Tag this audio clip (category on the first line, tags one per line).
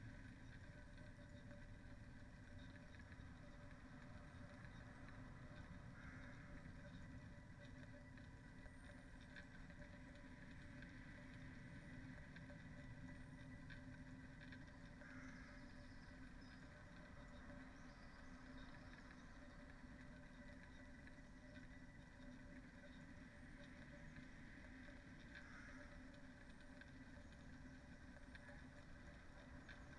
Soundscapes > Nature
weather-data
Dendrophone
natural-soundscape
data-to-sound
sound-installation
soundscape
artistic-intervention
raspberry-pi
field-recording
modified-soundscape
nature
alice-holt-forest
phenological-recording